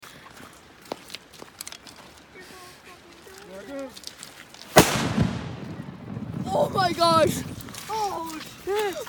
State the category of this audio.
Sound effects > Natural elements and explosions